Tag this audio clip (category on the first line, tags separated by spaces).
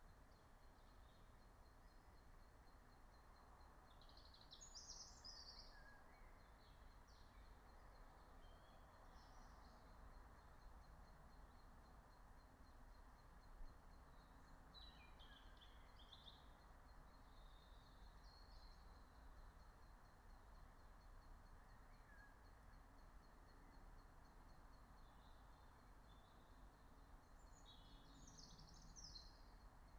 Soundscapes > Nature
meadow field-recording soundscape raspberry-pi phenological-recording nature alice-holt-forest natural-soundscape